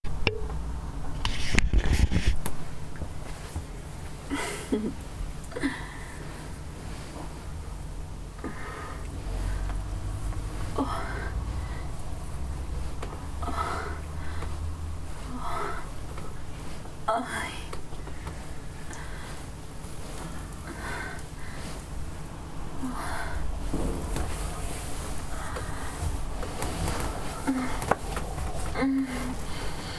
Sound effects > Human sounds and actions
couple love sex
a little chapter of sex of a couple in love